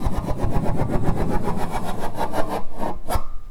Sound effects > Objects / House appliances
My fingers sliding on the metal opening of the kitchen ventilation system